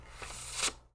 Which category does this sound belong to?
Sound effects > Objects / House appliances